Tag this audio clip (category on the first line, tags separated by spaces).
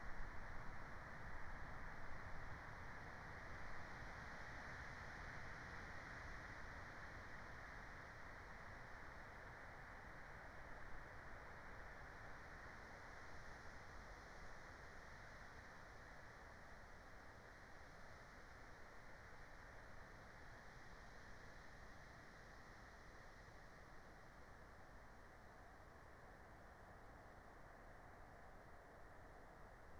Soundscapes > Nature
field-recording nature soundscape phenological-recording alice-holt-forest meadow natural-soundscape raspberry-pi